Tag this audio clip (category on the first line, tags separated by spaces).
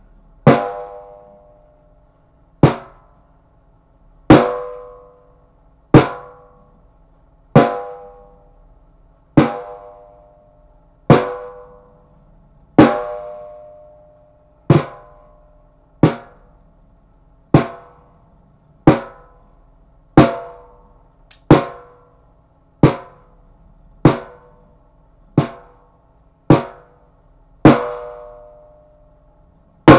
Music > Solo percussion
Blue-brand Blue-Snowball drum fast hit roll single snare snare-drum